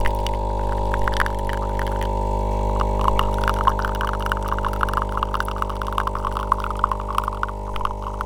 Sound effects > Objects / House appliances
Nespresso machine making coffee